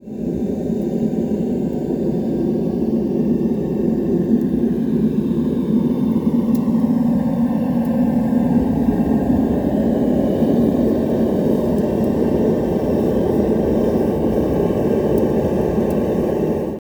Sound effects > Other
LPG high heat furnace
High-heat LPG furnace operating at temperatures above 1000˚C. This audio was recorded using a ZOOM H2essential recorder.